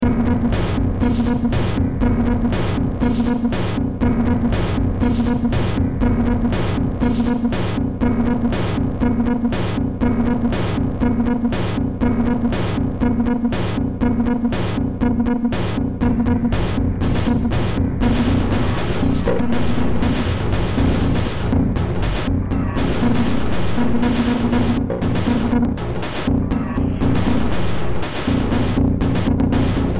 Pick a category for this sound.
Music > Multiple instruments